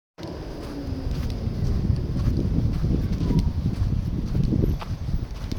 Soundscapes > Urban
Tampere tram recording
recording, Tampere, tram